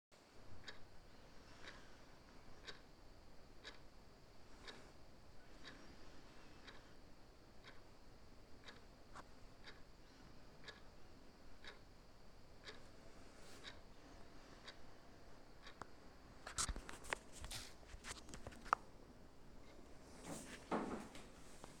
Sound effects > Other mechanisms, engines, machines
ticking of the clock
clock, ticking, time